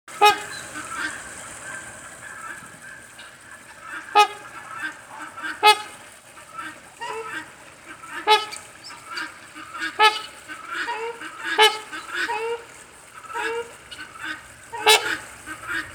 Sound effects > Animals
At Hope Ranch Zoo, resides this trumpeter swan, who sounds off its namesake. This bird was made famous by the book, The Trumpet of the Swan, by E.B. White of Charlotte's Web fame. Other birds, including a whole horde of guineafowl that also were given a standalone sound on the pack, and a ruddy shelduck, which makes fairly similar calls at 0:11. Recorded with an LG Stylus 2022.